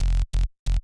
Instrument samples > Synths / Electronic
Compressed Bass white noise
Bass layered with noise compressed with a multiband compressor.